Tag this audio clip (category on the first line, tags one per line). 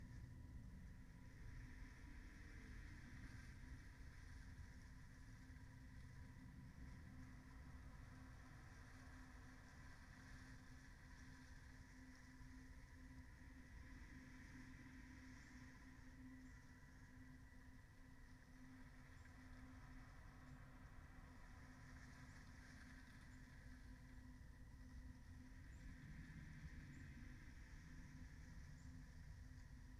Soundscapes > Nature
raspberry-pi
nature
natural-soundscape
modified-soundscape
artistic-intervention
alice-holt-forest
data-to-sound
field-recording
phenological-recording
weather-data
Dendrophone
sound-installation
soundscape